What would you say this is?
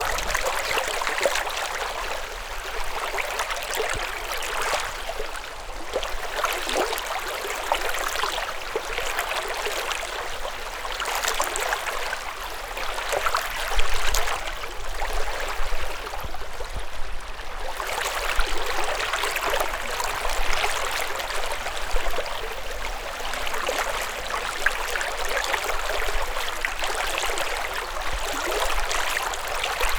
Soundscapes > Nature
FX River 05 Pans
Recorded at Jacobsburg State Park in PA.
babbling, brook, creek, flowing, river, water